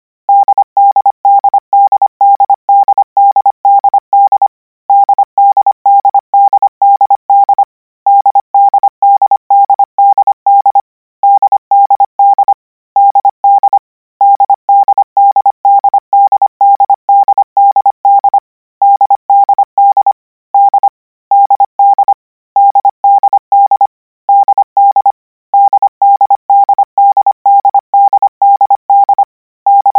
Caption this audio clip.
Sound effects > Electronic / Design
Koch 37 D - 200 N 25WPM 800Hz 90%
Practice hear letter 'D' use Koch method (practice each letter, symbol, letter separate than combine), 200 word random length, 25 word/minute, 800 Hz, 90% volume.